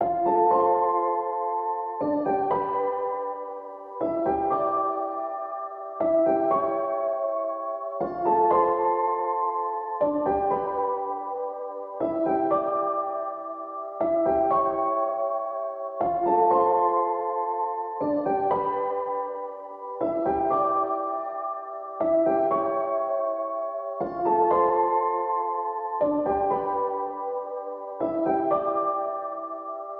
Music > Solo instrument
Piano loops 137 efect 4 octave long loop 120 bpm
music, simplesamples